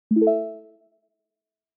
Sound effects > Other
done sound effect
done, effect, sound